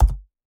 Sound effects > Objects / House appliances
Laptopkeyboard Type 5 Hit
Pressing keys on a laptop keyboard, recorded with an AKG C414 XLII microphone.
button, keyboard, laptop, office